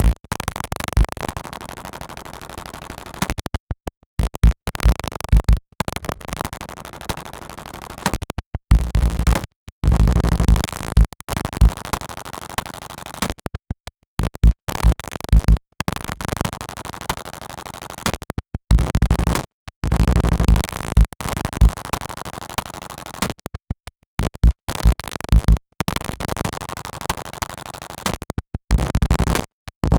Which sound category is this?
Soundscapes > Synthetic / Artificial